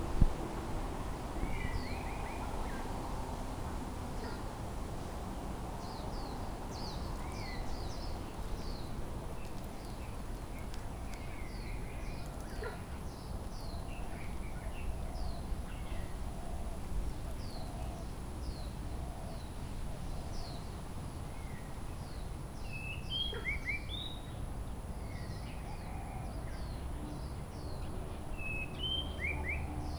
Soundscapes > Nature
TPE-011 Taiwan Park Birds & White Noise Background
A snapshot of sounds recorded in a park in Taiwan August 2025 with bird sounds, a dog barking irregularly, cars in background which sounds a little bit like "white noise".
Bird-sounds, Field-Recording